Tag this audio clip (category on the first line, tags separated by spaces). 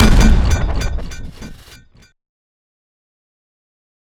Sound effects > Other
cinematic effects hit percussive sfx sharp